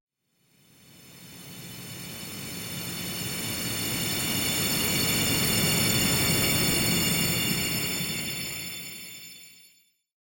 Sound effects > Electronic / Design
A simple, reverse magic SFX designed in Reaper with various plugins.
mid-tone, reverse, sweeping, dark, magic